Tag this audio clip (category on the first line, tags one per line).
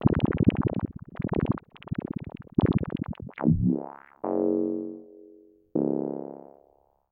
Sound effects > Experimental
fx
analogue
dark
vintage
korg
oneshot
effect
basses
bassy
weird
scifi
robot
pad
sci-fi
mechanical
complex
synth
snythesizer
robotic
bass
electro
electronic
machine
alien
retro
trippy
sweep
analog
sample
sfx